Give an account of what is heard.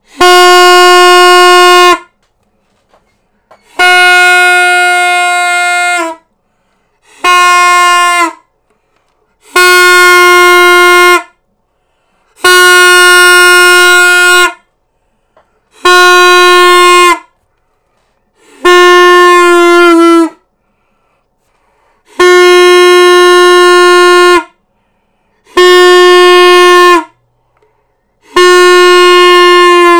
Sound effects > Objects / House appliances
HORNCele-Blue Snowball Microphone, CU Vuvuzuela, Blowing Nicholas Judy TDC
A vuvuzuela blowing.
blow, Blue-brand, Blue-Snowball, toot, vuvuzuela